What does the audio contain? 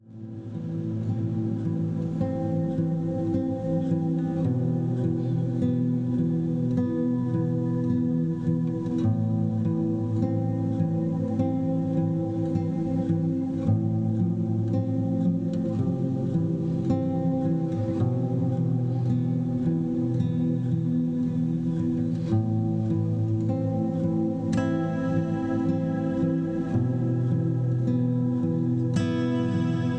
Music > Solo instrument
This Music is inspired by "At The End of Time" album, I played different variations looking for an emotion and I improvised on them and finally came up with this. The guitar is recorded by a Poco X3 Mobile Phone and Processed it through native studio one instruments and Valhalla Reverb and Delay Plugins. btw this is an really old track of mine!
Ambience, ambient, Atmospheric, Delay, Emotional, Guitar, Music, Reverb, Sorrowful, Soundtrack